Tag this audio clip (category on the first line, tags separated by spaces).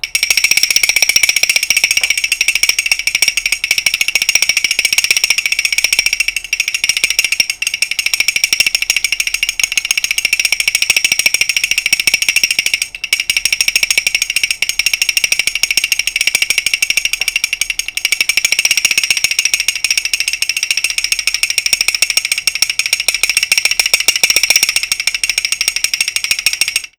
Sound effects > Objects / House appliances
chatter Blue-Snowball teeth cartoon woodpecker hit long Blue-brand